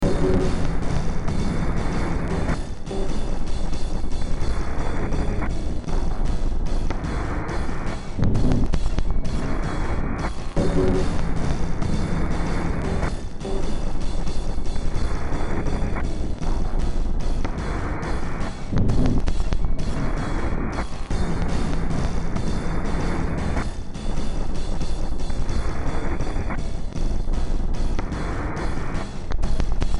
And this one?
Music > Multiple instruments
Demo Track #3605 (Industraumatic)
Ambient, Cyberpunk, Games, Horror, Industrial, Noise, Sci-fi, Soundtrack, Underground